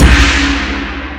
Instrument samples > Percussion
I use this abbreviated/shortened version. It doesn't sound weird with music. It's useful.